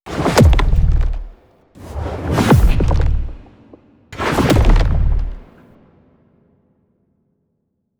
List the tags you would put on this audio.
Sound effects > Electronic / Design
bass sfx kick crumble foley deep big huge cinematic transition punchy crunchy thud punch trailer lowpitch low impact transient dark organic thump

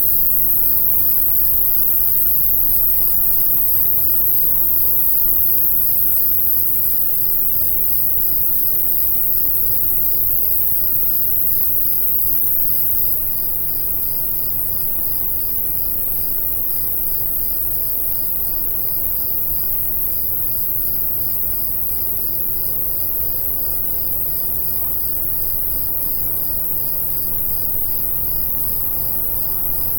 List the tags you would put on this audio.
Soundscapes > Nature
81000 August City Early-morning France FR-AV2 lake Mono NT5-o NT5o park Tarn Tascam

